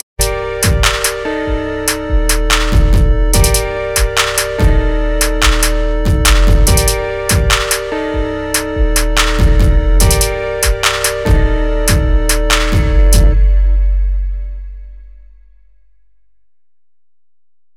Music > Multiple instruments
Anxious Piano Trap Loop 108BPM
Short trap beat idea made in FL11, 108bpm , mostly anxious feel to it.